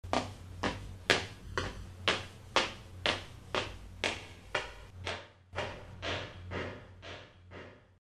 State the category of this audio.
Sound effects > Human sounds and actions